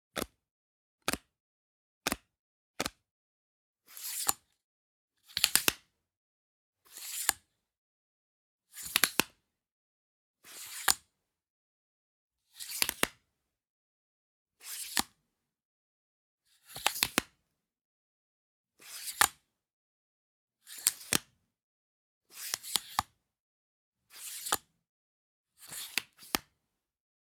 Sound effects > Objects / House appliances
Tripod Leg Unlock/Extend B
Extending and retracting a tripod. Recorded with a Zoom H2n, using only the mid microphone (single cardioid condenser)
camera, extend, lock, monopod, protract, retract, selfie, selfiestick, stick, tripod, unlock